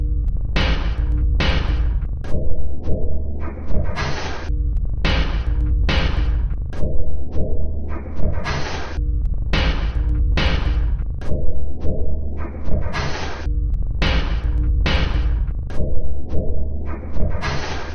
Instrument samples > Percussion
This 107bpm Drum Loop is good for composing Industrial/Electronic/Ambient songs or using as soundtrack to a sci-fi/suspense/horror indie game or short film.
Loopable, Packs, Samples, Weird, Loop, Drum, Industrial, Alien, Underground, Soundtrack, Dark, Ambient